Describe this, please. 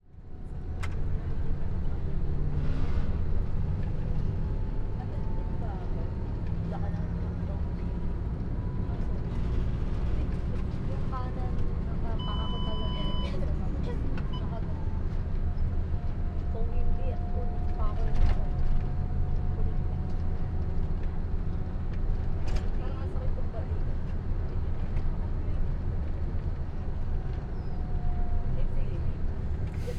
Sound effects > Vehicles
Travelling in the front of a bus. (Take 1) I made this recording while sitting in the front of a bus, just behind the driver, travelling from Manila to Palico, in the Philippines. One can hear the atmosphere in the vehicle, with the engine, the bumps of the road, the driver honking sometimes, as well as some people talking and/or eventually using their mobile phones to watch some videos or make some video calls (but nothing disturbing), and the doors of the bus opening and closing when someone comes in or exits. Recorded in August 2025 with a Zoom H5studio (built-in XY microphones). Fade in/out applied in Audacity.